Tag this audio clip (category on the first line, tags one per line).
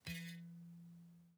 Sound effects > Other mechanisms, engines, machines
boing
sample